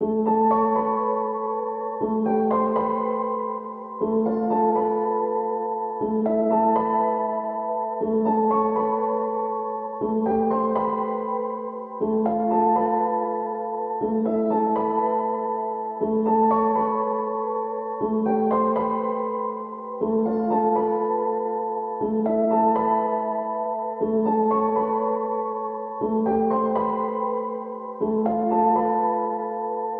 Music > Solo instrument
Piano loops 133 efect 4 octave long loop 120 bpm
Beautiful piano music . VST/instruments used . This sound can be combined with other sounds in the pack. Otherwise, it is well usable up to 4/4 120 bpm.